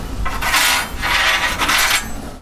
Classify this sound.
Sound effects > Objects / House appliances